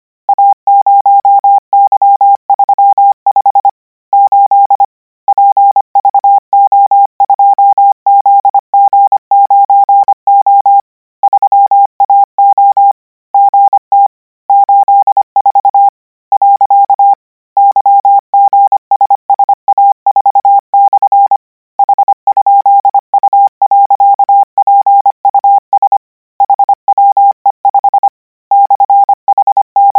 Sound effects > Electronic / Design
Koch 33 KMRSUAPTLOWI.NJEF0YVGS/Q9ZH38B?42 - 860 N 25WPM 800Hz 90%
Practice hear characters 'KMRSUAPTLOWI.NJEF0YVGS/Q9ZH38B?42' use Koch method (after can hear charaters correct 90%, add 1 new character), 860 word random length, 25 word/minute, 800 Hz, 90% volume. Code: a0y35 8 pvo2zg9o 3ao gt 84 . 2hf/mvje avg jyz fatl3k.nw 2vhr vf ysv49z o ?hiao wel 35v vakv5 r9kal4 4j 0o?h. aqvn2nky 05vs ua4uopsr epg9r. p09945r p5nzlj2wv jh e8a k vrrqpg 8ym4 a q43u8u59 e9? bhlv.l/ke nw jpr hzeyb 5era?h pgh p 5hpm 0oq4fqf e43oonz ygr 9/.u3 qg /vt4tuj4g ifjv 3ir ba?53tq59 t.k 04bvi3p u5i jp2 0h . j0rkpia ibmlhg0 zfgnhlz tpjmh9 tljaeqi4 t2l4yzelp v2iobfele 23ell5 4?54rv mrbjlmf3 zm8tf /zmfao/9 5 f 9r/ rfu ey jostfylaw gnfoai8 8nn8ks4 ap?/oi4wf 8 e 2 0nwgzaigf njhob2f9 qr04 b t ?p..g/ w3i8os q3f h2l.glbyh .2 lajgqs 48b r?kh9f fe0 kzzy0 4feu b/ ?tp9s4h44 qjhp3wm3k .0al gw 0k . 8moh8t9w s m?im .zk3?l9y tk0 w9/ 4fap emt sr uz bzkz4 l bl2q /.8ghk9?
radio, code